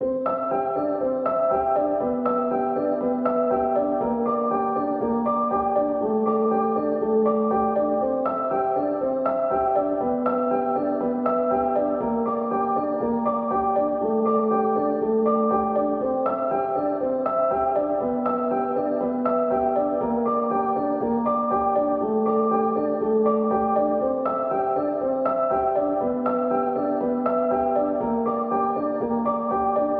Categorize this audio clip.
Music > Solo instrument